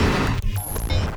Sound effects > Electronic / Design
A glitch one-shot SX designed in Reaper with Phaseplant and various plugins.
digital, pitched, hard, one-shot, stutter, glitch